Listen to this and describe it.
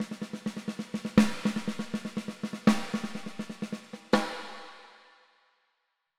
Music > Solo percussion
snare Processed - steady slow march beat - 14 by 6.5 inch Brass Ludwig
sfx, fx, snaredrum, brass, drumkit, flam, acoustic, kit, hit, realdrums, snares, drums, drum, rimshot, rim, realdrum, reverb, ludwig, snare, hits, oneshot, snareroll, roll, crack, rimshots, percussion, perc, processed, beat